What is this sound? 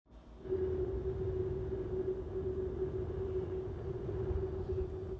Sound effects > Human sounds and actions
Wind noise (mimicked using hydro flask)
Created this sound using a metal hydro flask water bottle and blowing low continuous air above open lid. It sounded somewhat similar to wind noises someone may here through a cave or street alleyway. It also sounds a little like a walk in freezer but that is not the sound I was going for. Recorded with iphone 16 pro max and in quiet room.